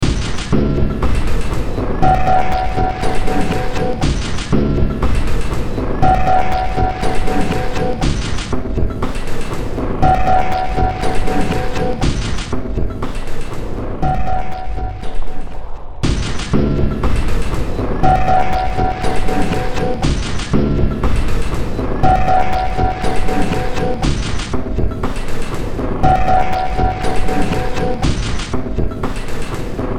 Music > Multiple instruments
Demo Track #3039 (Industraumatic)
Ambient, Cyberpunk, Games, Horror, Industrial, Noise, Sci-fi, Soundtrack, Underground